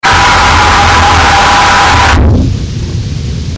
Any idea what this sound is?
Sound effects > Electronic / Design
Loud Jumpscare 3
Audio, Sound, Loud